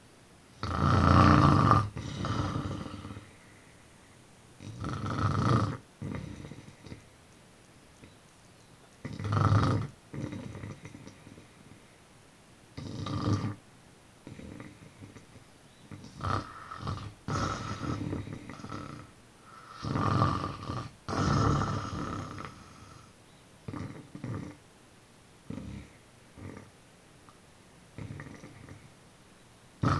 Sound effects > Human sounds and actions
Heavy snoring
An adult (62) snoring heavily with plenty of mucus and a short apnea moment between 0´52" and 1´03". Snores are heavy most of the time but breathing begins to improve from 2´32" until the end.